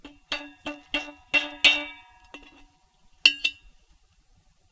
Sound effects > Experimental
contact mic in metal thermos, hitting the side because I can
Why did I bother to take this from my recordings again?
water, experimental, water-bottle, contact-microphone, contact-mic, thermos